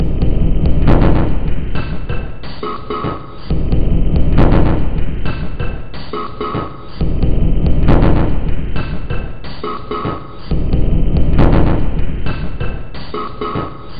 Instrument samples > Percussion
This 137bpm Drum Loop is good for composing Industrial/Electronic/Ambient songs or using as soundtrack to a sci-fi/suspense/horror indie game or short film.
Soundtrack, Ambient, Loopable, Samples, Dark, Underground, Loop